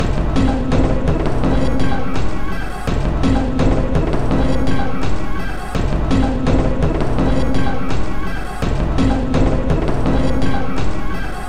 Instrument samples > Percussion
This 167bpm Drum Loop is good for composing Industrial/Electronic/Ambient songs or using as soundtrack to a sci-fi/suspense/horror indie game or short film.
Underground, Soundtrack, Samples, Dark, Drum, Loop, Weird, Loopable, Packs, Industrial, Alien, Ambient